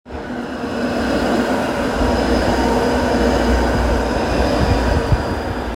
Sound effects > Vehicles
tram-apple-10
Recording of a tram (Skoda ForCity Smart Artic X34) near a roundabout in Hervanta, Tampere, Finland. Recorded with an iPhone 14.
vehicle, tram, outside, tramway